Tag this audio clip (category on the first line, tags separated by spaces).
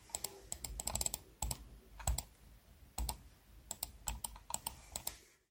Sound effects > Objects / House appliances
clicks computer game